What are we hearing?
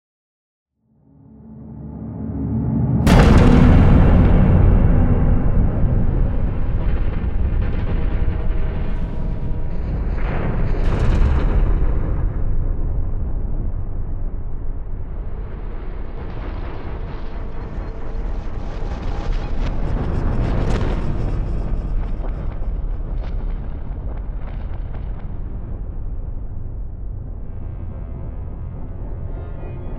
Sound effects > Other
Sound Design Elements SFX PS 075
bass, boom, cinematic, deep, effect, epic, explosion, game, hit, impact, implosion, indent, industrial, metal, movement, reveal, riser, stinger, sub, sweep, tension, trailer, transition, video, whoosh